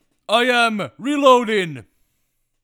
Speech > Solo speech
i am reloading
videogames, voice